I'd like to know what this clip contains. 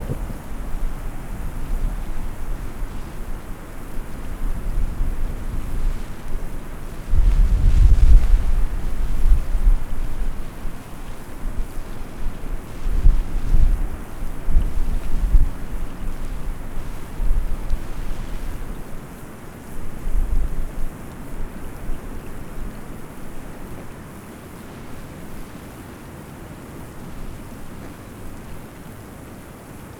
Soundscapes > Nature
The sound of electrical lines during a heavy rainstorm one evening in Manzanita, Oregon along the main drag. No real sounds of cars passing by or chatter, but a lot of wind.